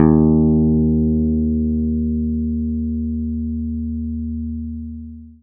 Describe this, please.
Instrument samples > String
One octave of real bass guitar recorded with a pick. The sound is completely dry and unprocessed, so you can shape it any way you like.